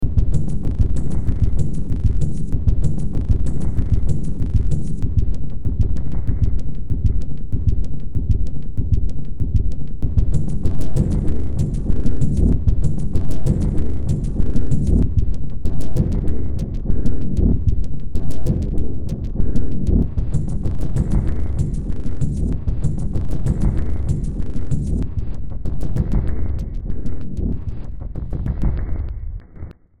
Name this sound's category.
Music > Multiple instruments